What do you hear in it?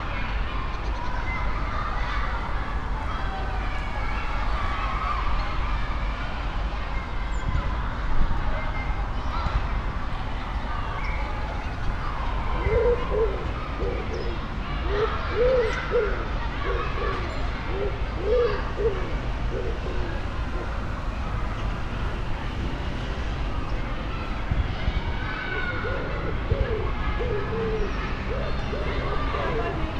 Soundscapes > Urban
City of Ghent 4
Soundscape of the city of Ghent. December 2025. Recorded with Stogie microphones in a Zoom F3.
ambiance, belgium, city, gent